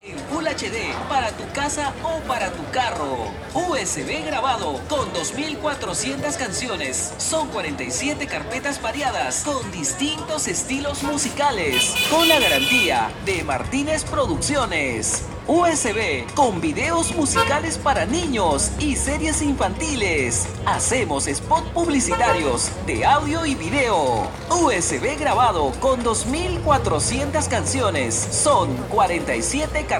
Speech > Solo speech
Vocal Paruro Lima Peru Vendedor de USB con canciones
Vocal Sound. Recorded audio heard on the streets of Paruro - Lima in a business that sells USBs recorded with songs. Paruro Street in Lima is a historic, bustling lane famed for its traditional watch repair shops, old-school jewelry stores, and classic street food like anticuchos. It feels like a step back in time with its colonial balconies and authentic local vibe.
peru, Paruro, lima, VOCAL, coster, usb, Street, vendors